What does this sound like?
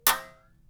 Sound effects > Other mechanisms, engines, machines
Handsaw Oneshot Metal Foley 27
handsaw, metal, twang